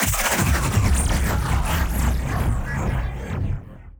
Sound effects > Electronic / Design
A synthetic designed impact hit created in Reaper with various plugins.